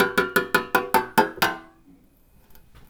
Sound effects > Other mechanisms, engines, machines
Handsaw Multi Hit Foley 3
foley, fx, handsaw, hit, household, metal, metallic, perc, percussion, plank, saw, sfx, shop, smack, tool, twang, twangy, vibe, vibration